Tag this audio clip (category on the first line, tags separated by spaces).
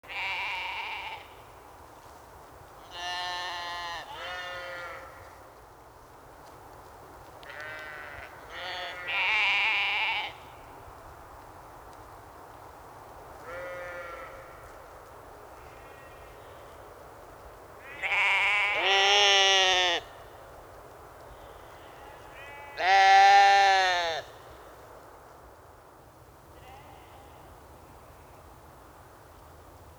Sound effects > Animals
field-recording skyline city park